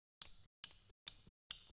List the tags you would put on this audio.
Instrument samples > Percussion
1lovewav clicks musical-clicks percussion

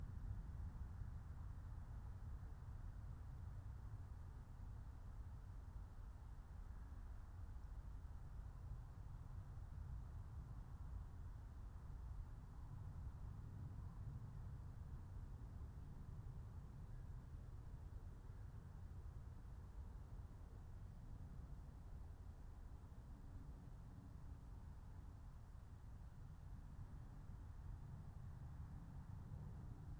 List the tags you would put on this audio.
Soundscapes > Nature
field-recording meadow raspberry-pi soundscape

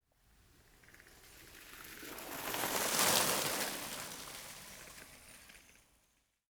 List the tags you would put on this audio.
Sound effects > Other mechanisms, engines, machines
bicycle bike field-recording forest stereo

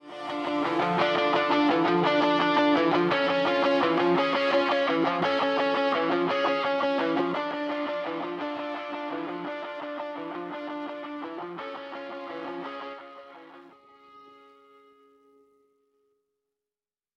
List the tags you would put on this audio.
Music > Other
sample guitar electric BM depressive

